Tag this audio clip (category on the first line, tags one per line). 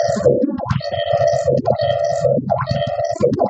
Sound effects > Electronic / Design
scifi
vst
wonky
dark-design
PPG-Wave
sci-fi
rhythm
dark-soundscapes
glitchy-rhythm
sound-design
dark-techno
noise
content-creator
noise-ambient
science-fiction
weird-rhythm
industrial-rhythm
drowning